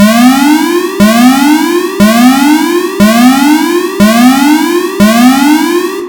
Sound effects > Electronic / Design
The Emergency Announcement tone for the Autonomous Facility Administration and Control System (AFACS). A 6-second 150 to 400 Hz chirp with some delay, reverb, and dampening. Made in Audacity.